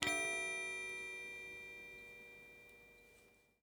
Instrument samples > Other
Closely miked recording of Chinese Baoding Balls made in 2018.